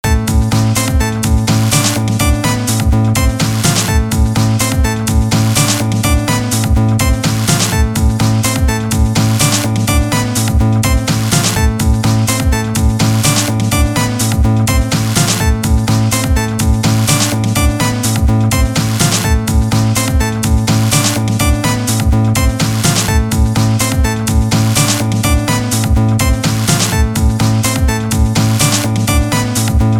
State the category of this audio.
Music > Solo instrument